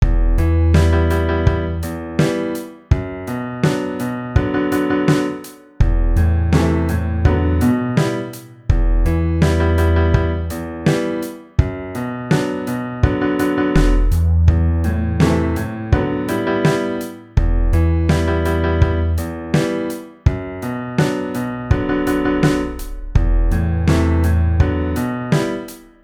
Music > Multiple instruments
A drum and piano loop, can be used for video games or videos, made with garage band. 83 bpm, 4/4 time signature, e Minor